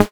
Instrument samples > Synths / Electronic
CINEMABASS 8 Ab
additive-synthesis, fm-synthesis, bass